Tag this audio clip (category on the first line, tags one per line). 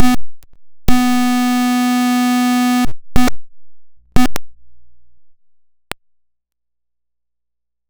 Sound effects > Electronic / Design
Alien
Analog
Bass
Digital
DIY
Dub
Electro
Electronic
Experimental
FX
Glitch
Glitchy
Handmadeelectronic
Infiltrator
Instrument
Noise
noisey
Optical
Otherworldly
Robot
Robotic
Sci-fi
Scifi
SFX
Spacey
Sweep
Synth
Theremin
Theremins
Trippy